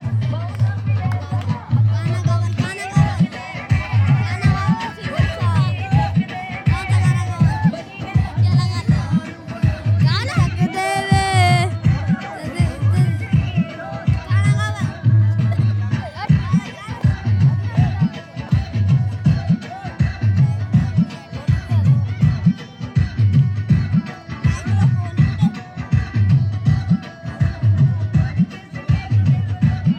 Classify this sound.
Soundscapes > Urban